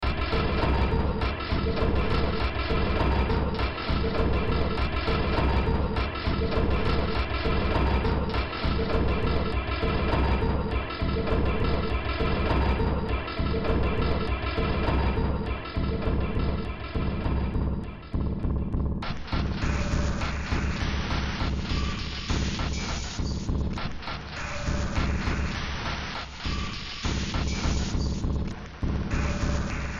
Music > Multiple instruments
Demo Track #3360 (Industraumatic)
Noise, Ambient, Underground, Games, Sci-fi, Cyberpunk, Soundtrack, Industrial, Horror